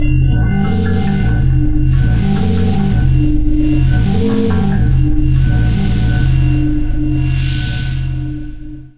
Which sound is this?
Instrument samples > Synths / Electronic
CVLT BASS 107
bass, clear, synth, synthbass, wavetable, subbass, subwoofer, low, sub, lfo, bassdrop, wobble, stabs, subs, drops, lowend